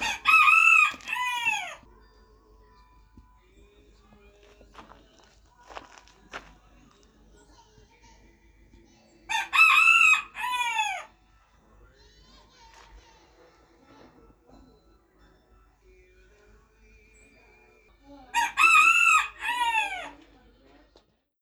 Sound effects > Animals
BIRDFowl-Samsung Galaxy Smartphone Rooster, Morning Crow, X3 Nicholas Judy TDC
A rooster crowing three times. Recorded at Hanover Pines Christmas Tree Farm.
crow, wake-up, rooster, cockerel